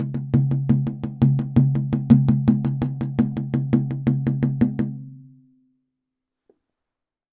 Music > Solo instrument
Delicate Tom Rhythm-002
Recording from my studio with a custom Sonor Force 3007 Kit, toms, kick and Cymbals in this pack. Recorded with Tascam D-05 and Process with Reaper and Izotope
Crash, Custom, Cymbal, Cymbals, Drum, Drums, FX, GONG, Hat, Kit, Metal, Oneshot, Paiste, Perc, Percussion, Ride, Sabian